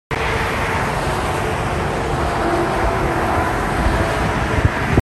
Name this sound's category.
Sound effects > Vehicles